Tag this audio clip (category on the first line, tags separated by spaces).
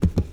Sound effects > Objects / House appliances
bucket clang clatter container drop fill foley handle hollow kitchen knock lid liquid metal plastic pour scoop spill tip tool water